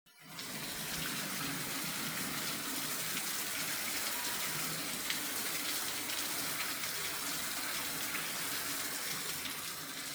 Sound effects > Natural elements and explosions
Rain drops 2
Rain drops sound effects, Recorded from mobile recorder .
drops, weather